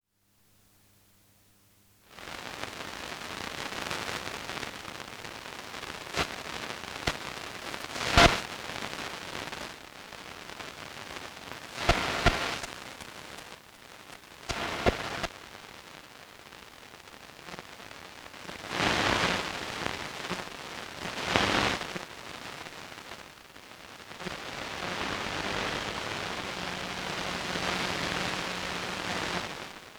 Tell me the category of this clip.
Sound effects > Objects / House appliances